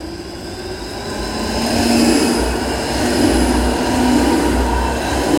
Sound effects > Vehicles
tram rain 09
rain, tram, motor